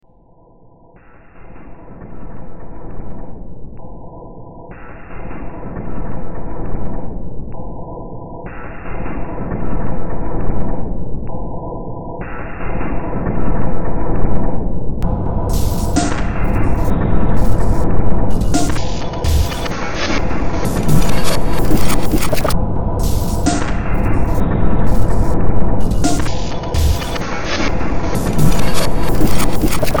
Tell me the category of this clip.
Music > Multiple instruments